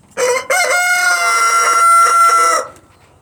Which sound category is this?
Sound effects > Animals